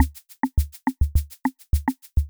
Music > Other
Smooth drum loop (Kick,Cabasa, Rim) -104 BPM
This is an old-fashioned smooth drumloop .(70s ) I created it with audacity,using white noise, DC-clicks and resonance filters.
Smooth, Beatbox, Drumloop, Vintage-Drummachine